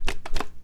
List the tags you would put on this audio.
Sound effects > Objects / House appliances
industrial
click
foley
plastic
clack
carton

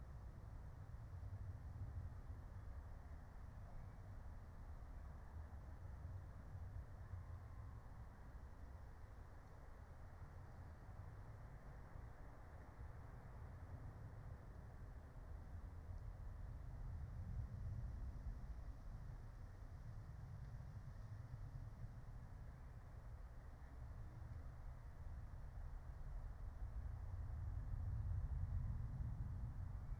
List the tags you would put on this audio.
Soundscapes > Nature
meadow
field-recording
soundscape
phenological-recording
nature

